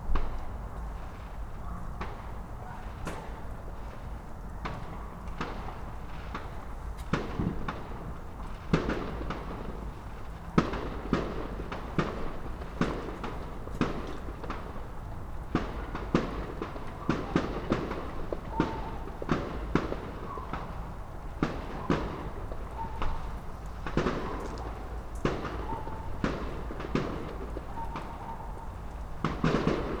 Soundscapes > Urban
Bonfire Night United Kingdom 2025
Ten minutes of fireworks during Bonfire Night on an urban street in the United Kingdom.
bonfire, firecrackers, fawkes, explosion, bang, bangs, field-recording, united, kingdom, boom, huge, night, rockets, fire-works